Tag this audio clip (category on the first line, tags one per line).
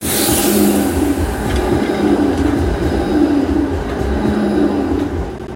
Sound effects > Vehicles
tram
tampere
sunny